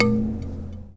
Music > Solo instrument
Marimba Loose Keys Notes Tones and Vibrations 4
foley, notes, fx, perc, marimba, woodblock, oneshotes, keys, tink, percussion, rustle, wood, loose, thud, block